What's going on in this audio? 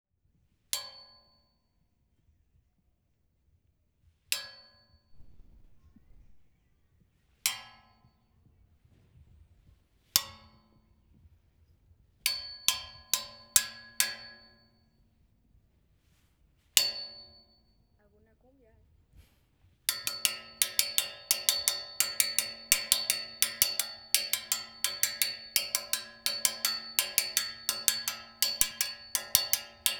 Sound effects > Objects / House appliances
Valla metal
Touching a metal surface with a branch in the middle of the El Picacho National Park.
fence, metal, park, percussion